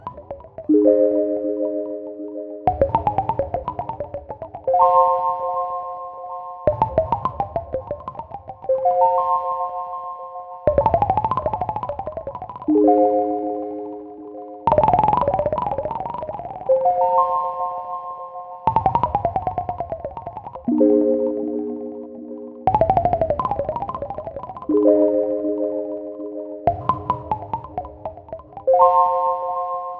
Music > Multiple instruments
Background Brilliant Shining Blip Blops with Chords
I know I have a personal love with blip blop sounds and I often get lost in creating backgrounds with it.
ambient, background, blip, chords, glitch, relaxing